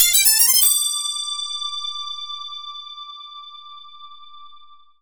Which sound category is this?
Sound effects > Electronic / Design